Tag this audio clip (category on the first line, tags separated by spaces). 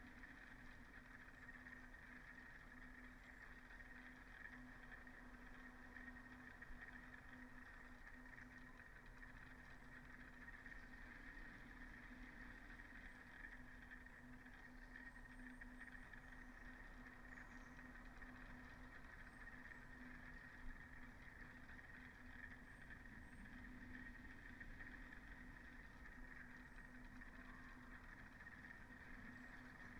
Soundscapes > Nature
modified-soundscape sound-installation nature data-to-sound soundscape Dendrophone phenological-recording